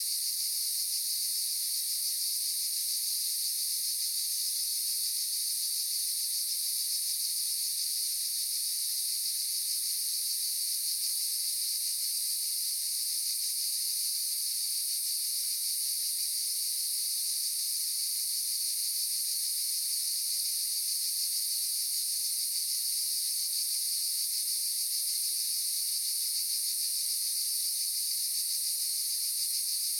Soundscapes > Nature
Cicada - Cigales - South of France
Recorded nearby Toulon, sounth of France.